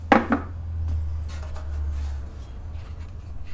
Sound effects > Other mechanisms, engines, machines
Woodshop Foley-084
bam, shop, thud, percussion, wood, boom, rustle, strike, fx, sound, little, oneshot, tools, tink, perc, crackle, bop, knock, pop, foley, sfx, bang, metal